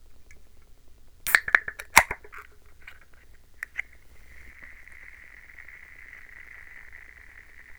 Sound effects > Objects / House appliances
Opening a can of coke followed by the fizz.